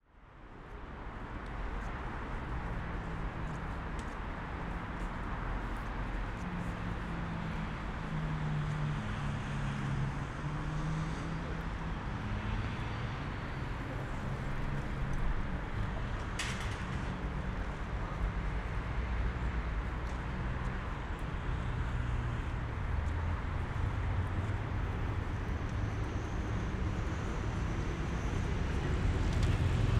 Soundscapes > Urban
250327 2880 FR Subway and walk from suburbs to Paris
Taking subway and walking, from suburbs to Paris, France (binaural, please use headset for 3D effects). First, I’m walking on the way for the train station. One can hear some other people walking and chatting around me, with the noise of traffic from the surroundings in the background. Then, at about #1:50, I’m approaching and coming into the train station. One can hear more people walking and chatting, as well as the beeps and noise of the ticket gates, then the atmosphere of the platform. At #4:10, the Metro (subway) is approaching, then I’ll come in, while a warning announcement about pickpockets is broadcasted. During the travel, one can hear people chatting, train doors opening and closing at each station, and some announcements. At #11:40, I’ll exit from the train, at Charles de Gaulle – Etoile Station, then I’ll walk through the long corridors of this underground station for about 6 minutes.